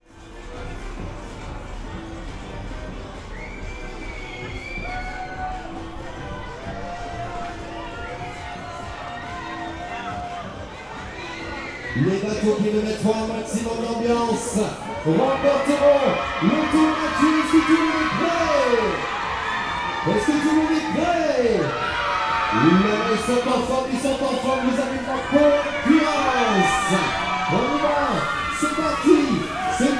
Soundscapes > Urban
250424 170852 FR Kids enjoying funfair in Paris
Kids and teen-agers enjoying a fairground ride in a funfair in Paris, France. (take 2) I made this recording while kids and teen-agers were enjoying a fairground ride in a famous funfair called ‘’la Foire du Trône’’, taking place in eastern Paris (France), every year during late spring. Recorded in April 2025 with a Zoom H6essential (built-in XY microphones). Fade in/out applied in Audacity.
children, lively, fun, machines, crowd, teen-agers, rollercoaster, noise, fairground, walla, noisy, people, France, Paris, soundscape, funfair, amusement-park, voices, ride, ambience, atmosphere, attraction, field-recording, kids, rides, scream, screaming, machine, music, roller-coaster